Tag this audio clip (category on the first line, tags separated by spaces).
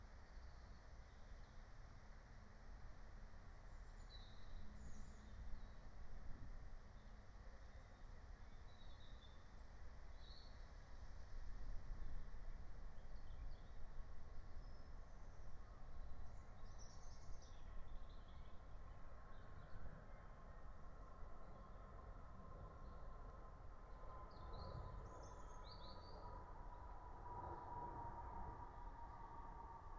Soundscapes > Nature
phenological-recording meadow